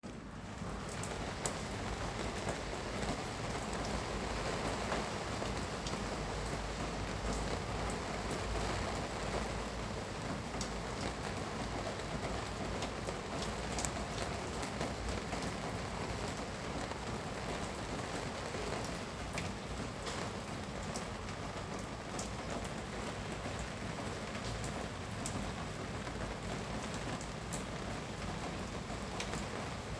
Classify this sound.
Sound effects > Natural elements and explosions